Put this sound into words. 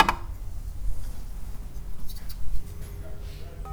Sound effects > Other mechanisms, engines, machines
Woodshop Foley-017
perc, tink, strike, wood, bop, bang, foley, rustle, percussion, pop, metal, little, shop, bam, boom, oneshot, fx, sound, tools, knock, crackle, thud, sfx